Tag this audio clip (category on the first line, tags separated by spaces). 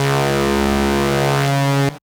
Sound effects > Experimental
alien analog analogue bass basses bassy complex dark effect electro electronic fx korg machine mechanical oneshot pad retro robot robotic sample sci-fi scifi sfx snythesizer sweep synth trippy vintage weird